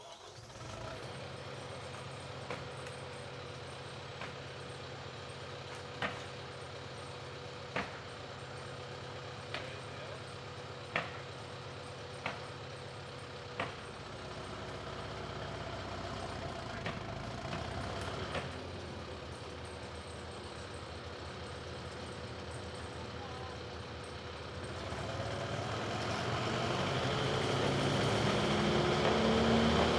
Soundscapes > Other
Hand-fed wood chipper start-up and chipping
Crew from Malamute Tree Services starts up a Bandit Intimidator 12XP hand-fed towable wood chipper and begins to feed it branches from a May tree at a residence in the Riverdale neighbourhood of Whitehorse, Yukon. The sound of a nail gun a block away is audible during the start-up. Chipper sound fades out at end. Recorded on handheld Zoom H2n in stereo on August 26, 2025.